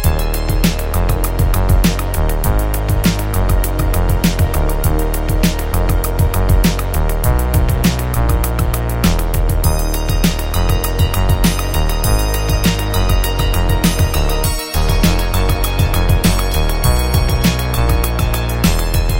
Music > Multiple instruments
Amp Beat (100 bpm, 8 bars) #2
Don't think it's particularly good compared with my more recent current stuff, but perhaps someone will find good use of it.
100-bpm-8-bar-loop, 100-bpm-loop, 8-bar-100-bpm, 8-bar-beat, 8-bar-loop, 8-bar-rap-instrumental, 8-bear-rap-hook, gangsta, gangsta-rap-beat, gangsta-rap-hook, gangsta-rap-loop, hip-hop-beat, hip-hop-hook, hip-hop-instrumental, hip-hop-loop, r-and-b-beat, r-and-b-loop, rap-beat, rap-hook, rap-instrumental, rap-loop